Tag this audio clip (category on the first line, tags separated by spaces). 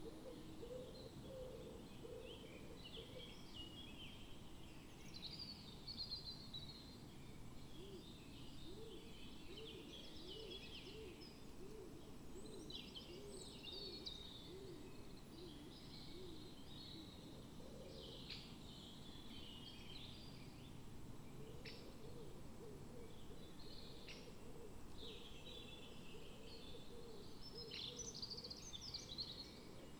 Soundscapes > Nature
modified-soundscape natural-soundscape raspberry-pi phenological-recording nature soundscape data-to-sound sound-installation